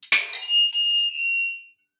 Sound effects > Other
A recording of a Metal gate being pushed open. Edited in RX 11.